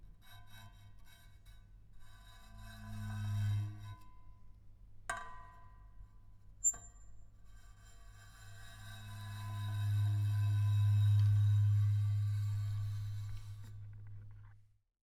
Sound effects > Other
Bowing metal newspaper holder with cello bow 6
Bowing the newspaper holder outside our apartment door. It's very resonant and creepy.
metal, atmospheric, scary, bow, fx, effect, eerie, horror